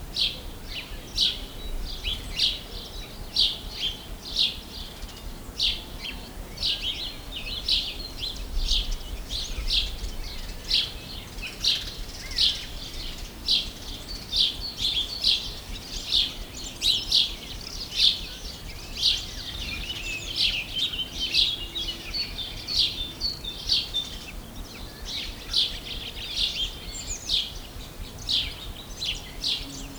Sound effects > Animals
250427-15h49 Gergueil Bird
Subject : A bird in Gergueil Date YMD : 2025 04 27 15h49 Location : Gergueil France. Hardware : Zoom H5 stock XY capsule. Weather : Processing : Trimmed and Normalized in Audacity.
2025, Ambience, April, bird, Gergueil, H5, Outdoor, Rural, Spring, Village, XY, Zoom